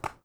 Sound effects > Objects / House appliances
SPRTField-Blue Snowball Microphone, CU Baseball Mitt, Catch Ball Nicholas Judy TDC
A baseball mitt catching a ball.
ball, baseball, Blue-brand, Blue-Snowball, catch, foley, mitt